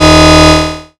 Instrument samples > Synths / Electronic
DRILLBASS 1 Eb
fm-synthesis, bass, additive-synthesis